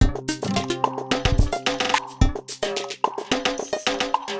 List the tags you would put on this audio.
Music > Multiple instruments
Bass Bassloop Beat Chill EDM Funky FX Groovy Heavy Hip Hop Melodies Percussion Sample Sub Subloop Trippy

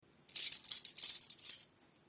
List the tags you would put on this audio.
Sound effects > Objects / House appliances
fork cutlery rummaging metal cook knife kitchen spoon

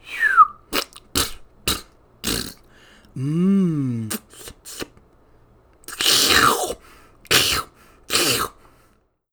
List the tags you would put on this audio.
Sound effects > Human sounds and actions
Blue-brand Blue-Snowball cartoon cone cream enjoy fall ice lick slurp

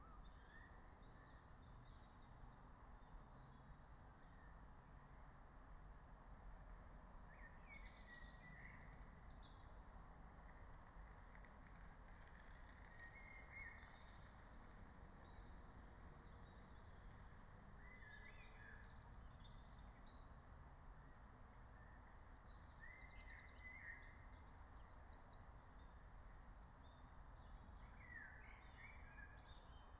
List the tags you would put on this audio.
Soundscapes > Nature
raspberry-pi meadow phenological-recording nature natural-soundscape soundscape field-recording alice-holt-forest

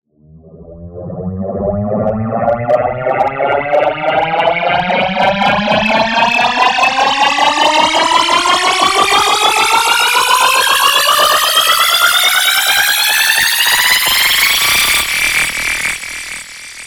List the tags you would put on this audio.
Sound effects > Electronic / Design
Powerup Phaser FX Riser Effect Sci-fi

